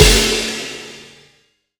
Instrument samples > Percussion
crash bass XWR 12
Old crashfiles low-pitched, merged and shrunk in length.